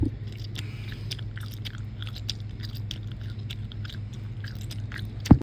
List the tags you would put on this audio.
Sound effects > Human sounds and actions
soundeffect
trigger
annoying

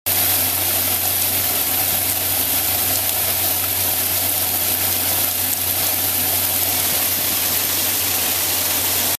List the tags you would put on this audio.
Sound effects > Experimental
street
field-recording
flow
liquid
fountain
park
water

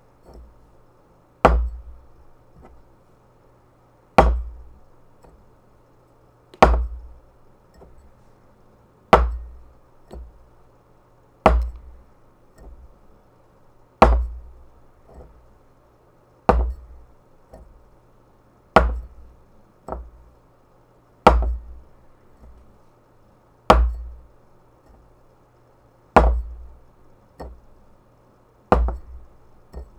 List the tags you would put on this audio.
Sound effects > Objects / House appliances
pint
foley
bar
pick-up

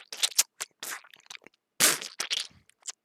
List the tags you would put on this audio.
Speech > Solo speech
squirt
squish
juicy
splat
blood
liquid

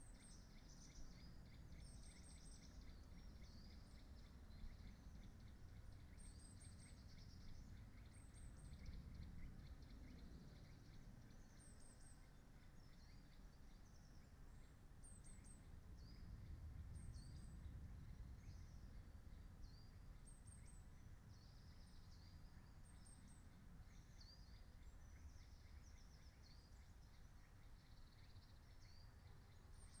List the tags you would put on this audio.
Soundscapes > Nature
alice-holt-forest
natural-soundscape
weather-data
phenological-recording
soundscape
nature
raspberry-pi
artistic-intervention
sound-installation
data-to-sound
modified-soundscape
field-recording
Dendrophone

